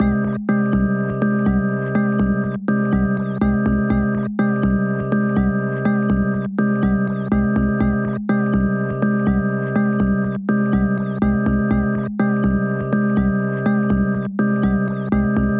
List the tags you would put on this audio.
Soundscapes > Synthetic / Artificial
bird
bell
ring
experimental
water

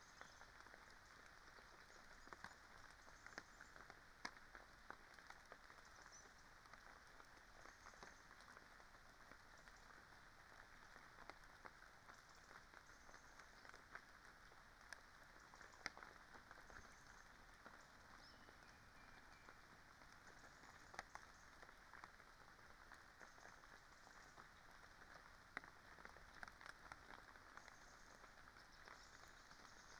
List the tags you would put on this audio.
Soundscapes > Nature
alice-holt-forest
artistic-intervention
data-to-sound
Dendrophone
field-recording
modified-soundscape
natural-soundscape
phenological-recording
raspberry-pi
sound-installation
soundscape
weather-data

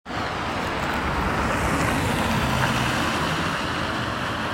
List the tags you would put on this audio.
Sound effects > Vehicles
automobile
car
vehicle

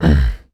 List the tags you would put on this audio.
Speech > Solo speech
annoyed
dialogue
FR-AV2
grumpy
Human
Male
Man
Mid-20s
Neumann
NPC
oneshot
singletake
Single-take
talk
Tascam
U67
upset
Video-game
Vocal
voice
Voice-acting

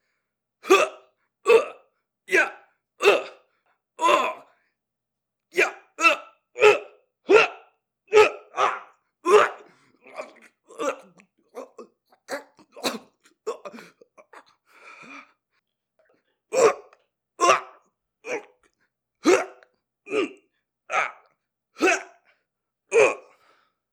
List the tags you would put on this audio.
Sound effects > Human sounds and actions
combat
enemy
fighting
gasp
goon
Henchman
punch
thug

